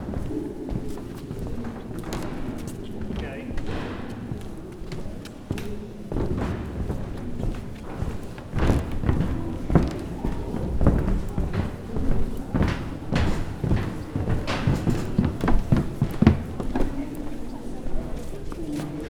Soundscapes > Indoors
The sound of steps on the stairs. Voices in the background. Sound recorded while visiting Biennale Exhibition in Venice in 2025 Audio Recorder: Zoom H1essential